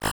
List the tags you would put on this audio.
Sound effects > Electronic / Design
Effect
Glitch

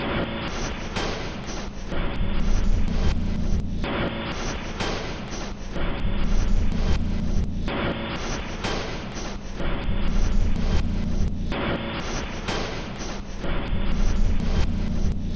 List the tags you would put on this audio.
Instrument samples > Percussion
Alien
Ambient
Dark
Drum
Loop
Loopable
Packs
Underground

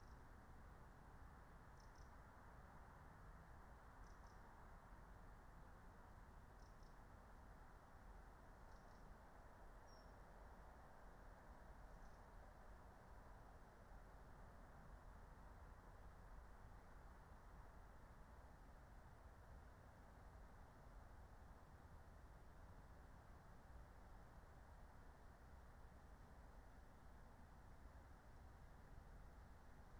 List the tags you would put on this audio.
Soundscapes > Nature
nature
phenological-recording
natural-soundscape
raspberry-pi
soundscape
meadow
alice-holt-forest
field-recording

